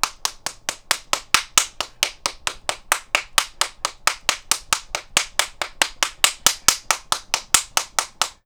Sound effects > Human sounds and actions
FGHTImpt-Blue Snowball Microphone, CU Smacks, Rapid Nicholas Judy TDC
Blue-brand, foley, fight, smacks, rapid, Blue-Snowball